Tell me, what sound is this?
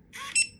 Sound effects > Other mechanisms, engines, machines
The sound of an electronic lock being unlocked. Recorded on an iPhone 13.